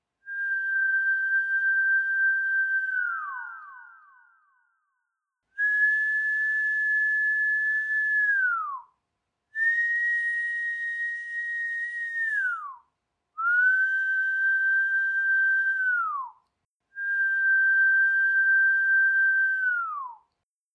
Sound effects > Human sounds and actions
Sustained whistling. The first one resonates as if there were a wide-open space all around. * No background noise. * No reverb nor echo. * Clean sound, close range. Recorded with Iphone or Thomann micro t.bone SC 420.